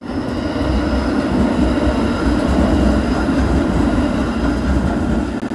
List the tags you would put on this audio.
Sound effects > Vehicles
tram
tampere
sunny

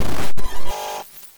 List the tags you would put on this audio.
Sound effects > Electronic / Design
glitch
hard
one-shot
pitched
stutter

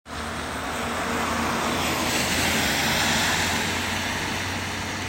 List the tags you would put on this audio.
Sound effects > Vehicles
tampere
car

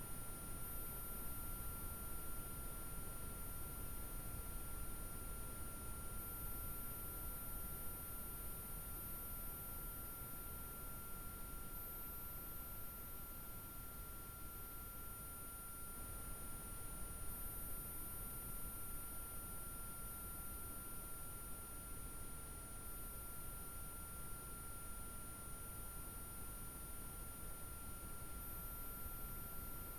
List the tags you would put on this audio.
Soundscapes > Synthetic / Artificial
subtle
tinnitus
generated
ear-rining
noise
synth
annoying
ringing
ear
synthesised
high-pitched
acouphene
audacity
effect

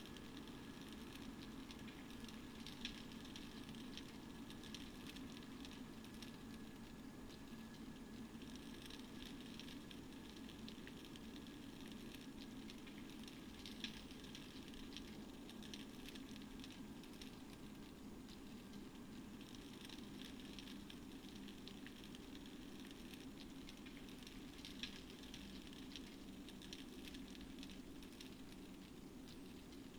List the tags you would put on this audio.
Soundscapes > Nature
alice-holt-forest
modified-soundscape
artistic-intervention
sound-installation
weather-data
raspberry-pi
Dendrophone
natural-soundscape
data-to-sound
soundscape

